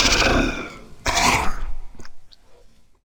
Sound effects > Experimental
Creature Monster Alien Vocal FX (part 2)-065
devil, Sfx, Alien, dripping, mouth, demon, Creature, fx, zombie, howl, gross, grotesque, growl, snarl, weird, Monster, bite, otherworldly